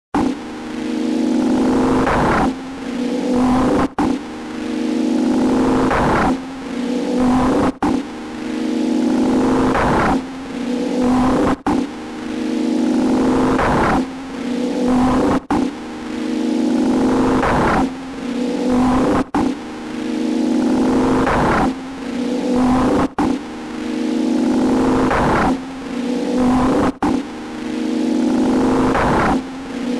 Soundscapes > Other
Tense anxious loop.
anxious, background-sound